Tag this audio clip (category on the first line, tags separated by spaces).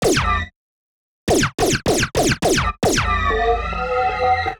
Sound effects > Electronic / Design
fire gun sci-fi short shot synthetic weapon